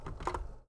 Sound effects > Objects / House appliances
COMTelph-Blue Snowball Microphone Nick Talk Blaster-Telephone, Receiver, Pick Up 04 Nicholas Judy TDC
A telephone receiver being picked up.
Blue-brand, Blue-Snowball, foley, pick-up, receiver, telephone